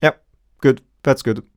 Speech > Solo speech
Relief - Yeah good thats good
Mid-20s, Tascam, voice, Vocal, dialogue, U67, Voice-acting, oneshot, NPC, Man, FR-AV2, relief, Single-take, singletake, Video-game, Human, talk, Male, Neumann